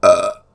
Sound effects > Human sounds and actions
HMNBurp-Blue Snowball Microphone, CU Big Nicholas Judy TDC
A big burp.
belch, big, Blue-brand, Blue-Snowball, burp